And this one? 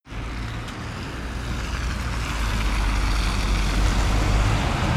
Sound effects > Vehicles
Bus driving towards the microphone , recorded on iphone 8 mic